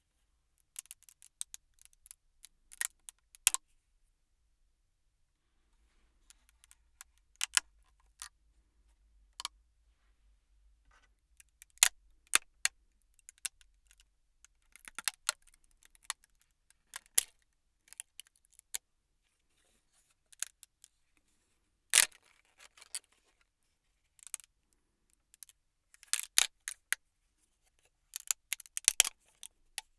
Sound effects > Objects / House appliances
Crackling plastic aka 3D support removal
Recorded this sound while removing the supports of the PETG material printed 3d model. Can find a great use in designing the sound for breaking a plastic part/toy and etc.